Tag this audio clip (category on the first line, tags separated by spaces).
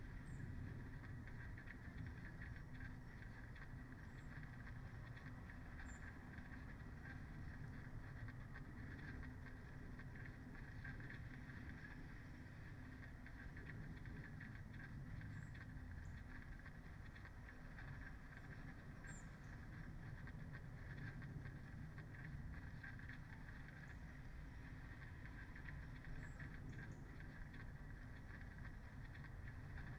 Soundscapes > Nature
artistic-intervention nature raspberry-pi sound-installation natural-soundscape Dendrophone field-recording phenological-recording alice-holt-forest soundscape data-to-sound modified-soundscape weather-data